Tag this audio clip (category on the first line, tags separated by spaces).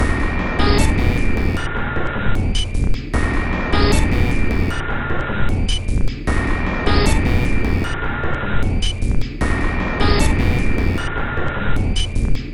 Instrument samples > Percussion
Alien Underground Dark Packs Soundtrack Industrial Ambient Weird Samples Drum Loopable Loop